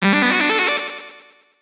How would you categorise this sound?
Sound effects > Electronic / Design